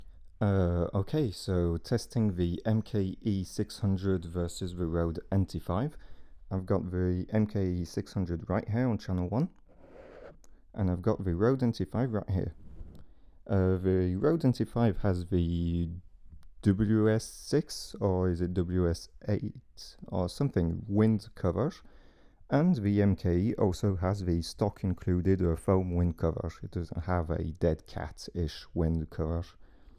Soundscapes > Other
Subject : Trying out my new microphone, the MKE600, along side a Rode NT5 so I can compare the pick-up pattern and such. Date YMD : 2025 June 27 and 28 Location : Albi 81000 Tarn Occitanie France. Hardware : Tascam FR-AV2 Sennheiser MKE-600 with stock windcover Left channel, Rode NT5 with WS8 windcover right channel. Weather : Processing : Trimmed and sliced. Added a 1000hz sinewave at cuts.
nature, field-recording, Tascam, Rode, hardware-testing, expedition, car, hypercardioid, noise